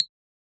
Sound effects > Objects / House appliances
Drop PipetteDripFast 3 Hit
Water being released from a cosmetic pipette into a small glass jar filled with water, recorded with a AKG C414 XLII microphone.
drop, water